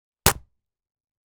Sound effects > Objects / House appliances
Stumping soda can crush 6
Subject : Stumping a soda-can flat. They were the tall 33cl cola kind. Date YMD : 2025 July 20 Location : Albi 81000 Tarn Occitanie France. Sennheiser MKE600 P48, no filter. Weather : Processing : Trimmed in Audacity. Notes : Recorded in my basement.
33cl, 33cl-tall, aluminium, aluminium-can, Can, compacting, crumple, crushing, empty, fast, fast-crush, flat, FR-AV2, metal, metallic, MKE600, Sennheiser, Soda, Soda-can, Sodacan, stepping, stomp, Stump, Stumping, tall, Tascam